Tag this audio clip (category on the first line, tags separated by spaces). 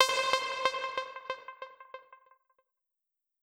Instrument samples > Synths / Electronic
140bpm audacity C6 flstudio24 GuitarRig Vanguard